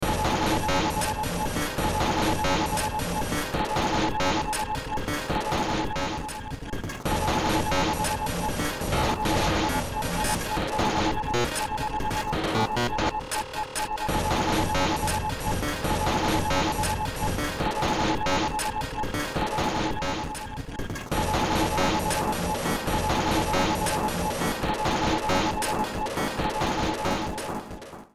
Music > Multiple instruments
Short Track #2934 (Industraumatic)
Noise, Horror, Sci-fi, Industrial, Ambient, Soundtrack, Underground, Cyberpunk, Games